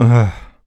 Speech > Solo speech
Annoyed - Ughh 2
Man, Single-take, upset, urgh